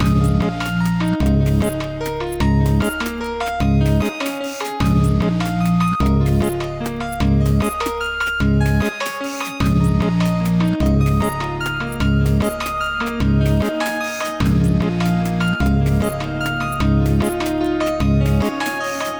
Music > Multiple instruments
Trill Journey Beat Loop 150BPM
A chill beat and melody loop I created with analog and digital gear
drums, 3, drumbeat, loop, beat, 150bpm, triplet, kit, triphop, hiphop, kitloop, keyloop, ambient, drum, drumloop, heavenly, loopable, key, melody, spacey, keys, electro, beatloop